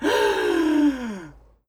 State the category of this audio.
Sound effects > Human sounds and actions